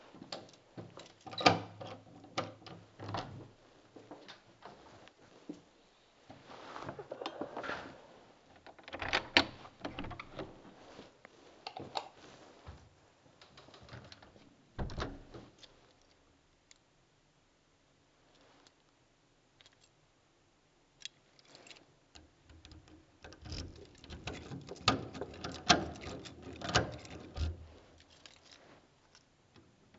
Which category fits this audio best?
Soundscapes > Indoors